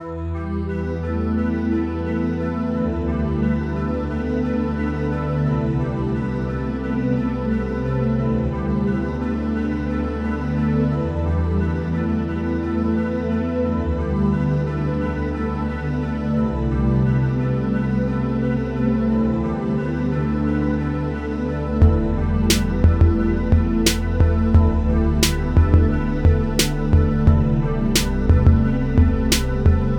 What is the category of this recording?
Music > Multiple instruments